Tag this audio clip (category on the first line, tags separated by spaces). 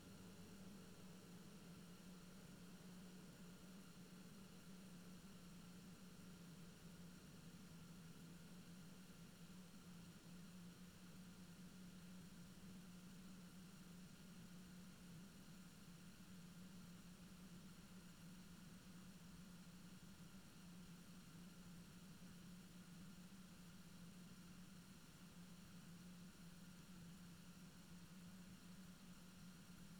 Soundscapes > Nature
alice-holt-forest field-recording meadow natural-soundscape soundscape